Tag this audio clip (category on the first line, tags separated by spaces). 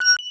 Sound effects > Electronic / Design
alert notifications digital messages interface button menu options UI